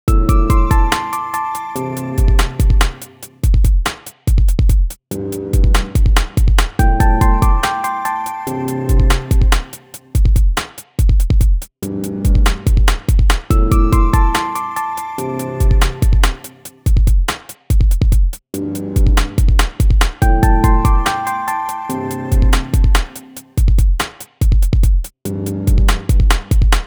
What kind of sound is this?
Music > Multiple instruments
Trip Hop Key Beat Loop in A Maj 143bpm
a chill beat and melody loop made in FL Studio using Pigments and processed with Reaper
amaj, beats, chill, drums, hiphop, idea, key, loop, loopable, triphop